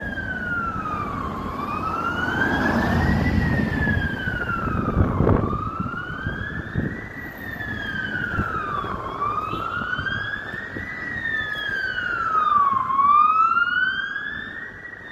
Soundscapes > Urban
Field Recording of a Police Siren.
City, Field-recording, Motorway, Police-Siren